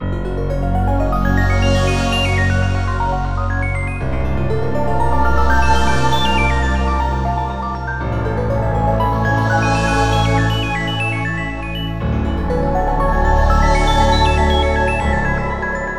Music > Multiple instruments
Elysian Depths Loop (120 BPM, 8 Bars)
120-bpm, Dylan-Kelk, gleaming-ocean, glittering-ocean, glorious-ocean, glorious-sea, Lux-Aeterna-Audio, ocean-documentary-music, ocean-documentary-theme, ocean-loop, ocean-music, ocean-soundtrack, ocean-theme, soothing-loop, swimming-theme, vast-ocean, water-level, water-level-music, water-level-theme, water-theme